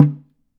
Sound effects > Other mechanisms, engines, machines
metal shop foley -212
bam, bang, boom, bop, crackle, foley, fx, knock, little, metal, oneshot, perc, percussion, pop, rustle, sfx, shop, sound, strike, thud, tink, tools, wood